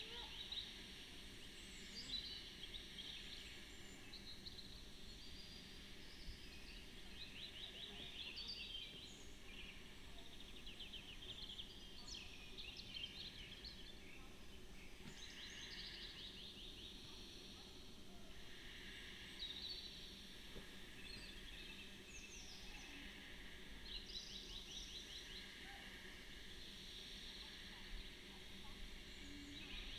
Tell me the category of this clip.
Soundscapes > Nature